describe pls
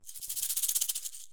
Instrument samples > Percussion
Dual shaker-014
sampling, recording, percusive